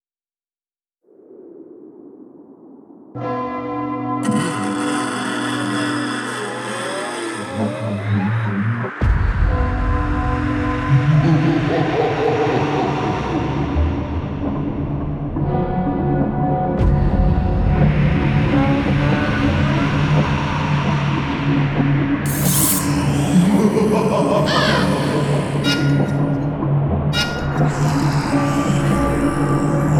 Music > Multiple instruments
Halloween sequence1
ghost, spooky, halloween, horror, scary